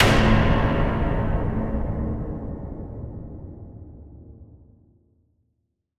Music > Multiple instruments
Jumpscare (Icy Chill) 2
startling-sound, what-the, loud-jumpscare-sound, horror-hit, horror-sound, jumpscare-sound-effect, scary-sound, thrill-of-fear, Lux-Aeterna-Audio, horror-impact, jumpscare-sound, whack-hectic-guy, cinematic-sting, spooky-hit, cinematic-hit, loud-jumpscare, startled, Dylan-Kelk, cinematic-stab, horror-sting, spooky-sound, jumpscare-noise, spooky-cinematic-sting, jumpscare, startled-noise, horror-stab